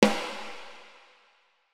Music > Solo percussion
Snare Processed - Oneshot 148 - 14 by 6.5 inch Brass Ludwig
acoustic, reverb, drumkit, percussion, processed, kit, crack, snare, realdrum, hit, flam, beat, perc, ludwig, hits, rim, snaredrum, roll, snares, drums, rimshot, oneshot, drum, snareroll, brass, realdrums, sfx, fx, rimshots